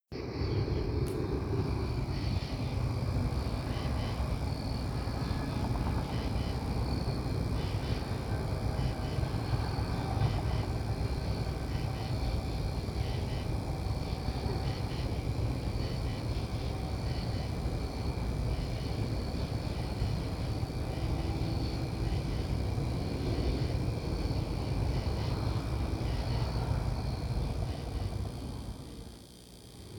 Soundscapes > Nature
A blend between nature and artificial industry. I used the sounds from my fish tank filter and a pond outside near the road. I included fade in and fade out effects to make the looping ease in. I also made the hum of the fish tank filter cater towards the left headphone channel. I wanted the sound to come off as uncanny and anxious. I am a beginner so it is probably not perfect but I hope you enjoy it anyways :)